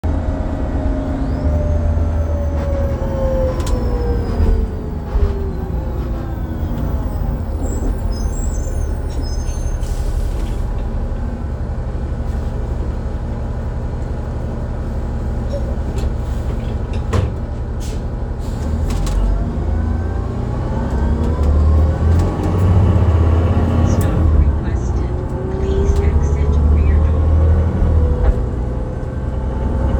Sound effects > Vehicles
2007 New Flyer D40LFR Transit Bus #2 (MiWay 0735)
I recorded the engine and transmission sounds when riding the Mississauga Transit/MiWay buses. This is a recording of a 2007 New Flyer D40LFR transit bus, equipped with a Cummins ISL I6 diesel engine and Voith D864.5 4-speed automatic transmission. This bus was retired from service in 2025.
2007, bus, cummins, d40, d40lf, d40lfr, driving, engine, flyer, isl, mississauga, miway, new, public, ride, transit, transmission, transportation, truck, voith